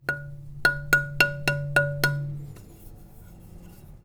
Music > Solo instrument
Marimba Loose Keys Notes Tones and Vibrations 21-001
tink, oneshotes, fx, keys, perc, marimba, woodblock, block, notes, thud